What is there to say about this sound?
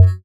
Instrument samples > Synths / Electronic
BUZZBASS 4 Gb
additive-synthesis, bass, fm-synthesis